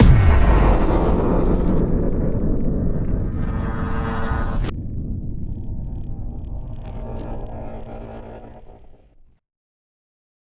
Sound effects > Other
Sound Design Elements Impact SFX PS 120
All samples used in the production of this sound effect are field recordings that I recorded myself. I mixed the field samples with samples designed in the ASM Hydrasynth Deluxe synthesizer. Field recording equipment: Tascam Portacapture x8 and microphone: RØDE NTG5. Samples of various kick types recorded by me and samples from the ASM Hydrasynth Deluxe were layered in Native Instruments Kontakt 8, and then final audio processing was performed in REAPER DAW.
design, impact, effects, cinematic, shockwave, heavy, transient, thudbang, force, hard